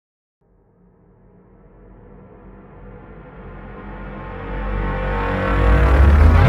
Sound effects > Electronic / Design
unfa braam riser
alien, anime, attack, biohazard, bionic, braam, cinematic, dark, electro, electronic, fate, heaven, horn, magic, powerup, processed, reversed, riser, sci-fi, space, synth, transformers